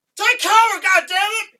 Speech > Solo speech
Battle - Take cover GD
Yelling someone to take cover Voice - Timothy Whiting
Combat, Battle, Scream